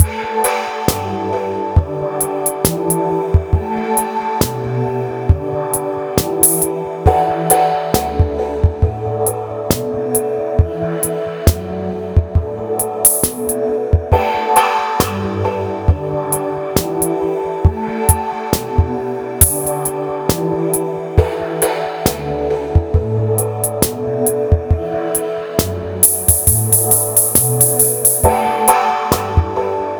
Music > Multiple instruments
made in Reason 13 G Lydian two chord shuttle GMaj 6/9 DMaj9 6/9 bpm 68
multisamplechoir, music, propellerhead, vocalsynth, vocoder